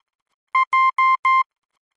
Sound effects > Electronic / Design
A series of beeps that denote the letter J in Morse code. Created using computerized beeps, a short and long one, in Adobe Audition for the purposes of free use.
Language, Telegragh